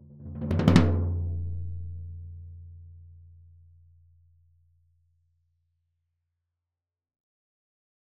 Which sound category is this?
Music > Solo percussion